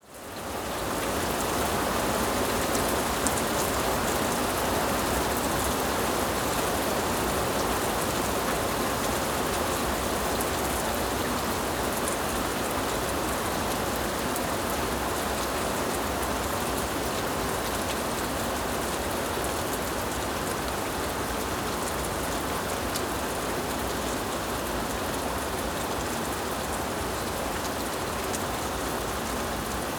Soundscapes > Nature
A recording of a heavy shower from an open window.
heavy, open, Rain, rersidential, shower, window